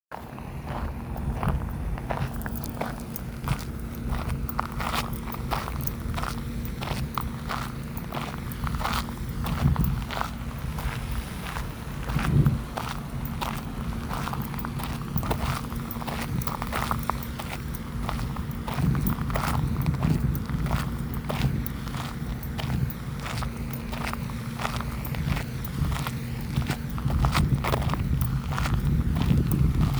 Soundscapes > Nature
walk in park near water (1)
A walk in the park near water in Texas
gravel, Nature, walk, footsteps, water